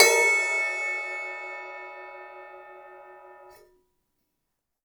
Music > Solo instrument
Sabian 15 inch Custom Crash-8
15inch, Crash, Custom, Cymbal, Cymbals, Drum, Drums, Kit, Metal, Oneshot, Perc, Percussion, Sabian